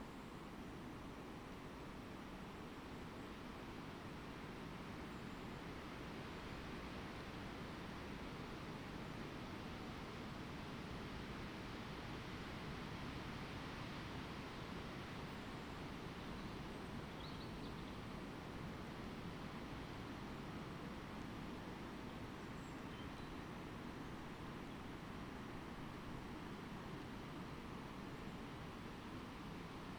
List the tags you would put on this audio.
Soundscapes > Nature
raspberry-pi weather-data sound-installation modified-soundscape phenological-recording nature soundscape field-recording Dendrophone artistic-intervention data-to-sound alice-holt-forest natural-soundscape

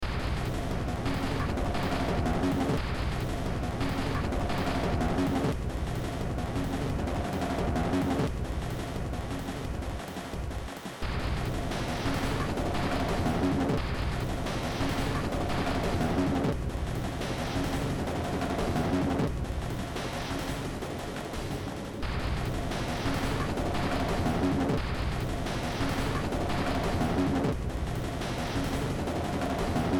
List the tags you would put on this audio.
Music > Multiple instruments
Games Soundtrack Industrial Cyberpunk Noise Underground Ambient Sci-fi Horror

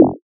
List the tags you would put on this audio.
Instrument samples > Synths / Electronic
bass fm-synthesis additive-synthesis

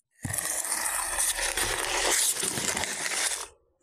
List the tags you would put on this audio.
Sound effects > Objects / House appliances
drag Sample Slipper Slide Slipping MobileRecord Pull Push pail Moving Slip dragging bucket Hit

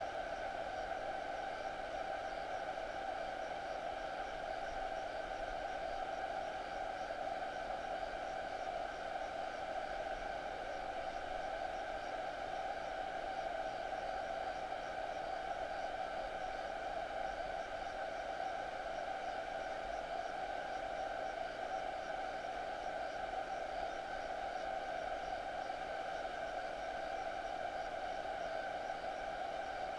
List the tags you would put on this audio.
Soundscapes > Urban
sci-fi antenna field-recording ambience field

